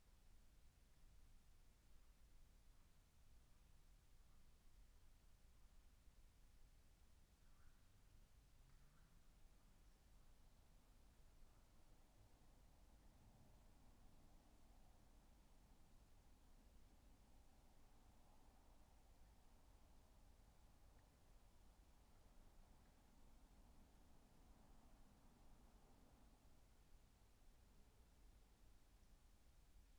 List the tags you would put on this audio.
Soundscapes > Nature
data-to-sound
weather-data

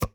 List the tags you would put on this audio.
Sound effects > Other
interface
game
playing
ui
cards

